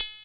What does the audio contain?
Instrument samples > Synths / Electronic
606ModHH OneShot 01
606, Analog, Drum, Kit, Mod, Modified, music, Vintage